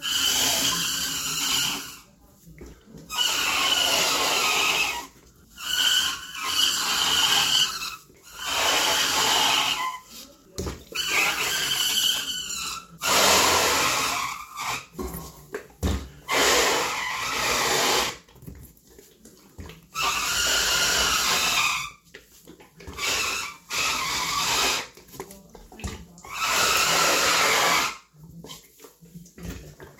Sound effects > Vehicles
VEHSkid-Samsung Galaxy Smartphone, CU Tires, Screeching, Simulation, Hot Water Bottle Thru Tiles Nicholas Judy TDC
Tires screeching. Simulated using a hot water bottle sliding through tiles.
tires, brake, squeal, tyres, tire, screech, peel-out, Phone-recording, skid, tyre